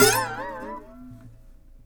Music > Solo instrument
acoustic guitar slide9
acosutic, chord, chords, dissonant, guitar, instrument, knock, pretty, riff, slap, solo, string, strings, twang